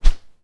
Sound effects > Objects / House appliances
Whoosh - Plastic Hanger 2 (middle clip) 2
Subject : Whoosh from a plastic clothe hanger. With clips adjustable across the width of it. I recorded whooshes with the clip on the outer edge and near the center hanger. Middle clip here refers to being closest to the middle of the hanger. Date YMD : 2025 04 21 Location : Gergueil France. Hardware : Tascam FR-AV2, Rode NT5 pointing up and towards me. Weather : Processing : Trimmed and Normalized in Audacity. Probably some fade in/out.